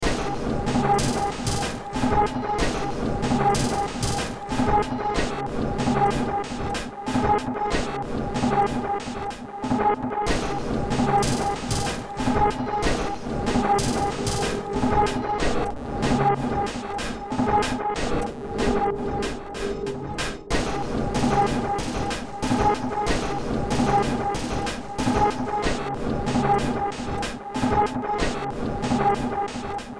Music > Multiple instruments
Short Track #3481 (Industraumatic)
Underground, Ambient, Cyberpunk, Sci-fi, Noise, Industrial, Games, Soundtrack